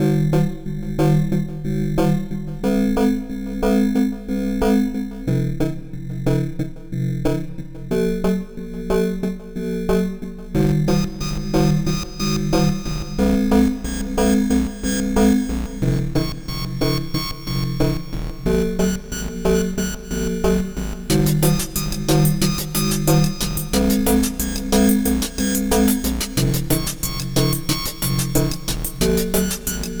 Music > Multiple instruments
Downtemp amb 54E7
Some olde style chill stuff just made on caustic on an android. It sort of has an early 90's vibe to it. Some lost techno stuff. 91 bpm (F, A#, D# G#)
Ambient, Psychill, 91, Dark, Experimental, Chill, Electronic, Cinematic, Downtempo, Atmosphere